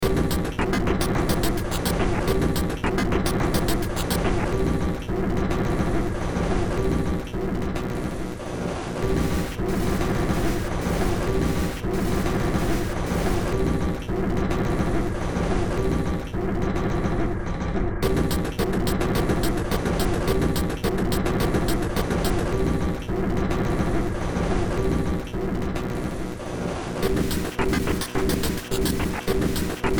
Music > Multiple instruments
Short Track #3593 (Industraumatic)
Track taken from the Industraumatic Project.
Ambient, Cyberpunk, Games, Horror, Industrial, Noise, Sci-fi, Soundtrack, Underground